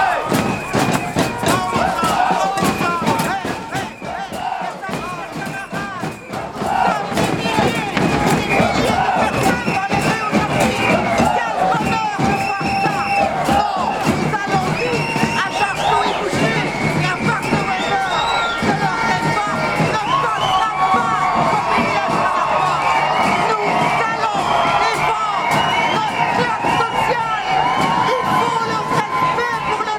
Soundscapes > Urban
Manifestation in Brussels / Nous allons défendre notre classe sociale
13 th of February 2025, Brussels : national manifestation / demonstration for public services and purchasing power 13 février 2025, Bruxelles : manifestation / grève nationale pour les services publics et le pouvoir d'achat Recorded with Microphone = Sanken CMS-50 (MS) decoded in STEREO Recorder = Sound Devices MixPre 3 I REF = 25_02_13_12_57
antifascist, brussels, strike